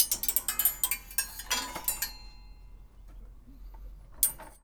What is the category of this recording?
Sound effects > Objects / House appliances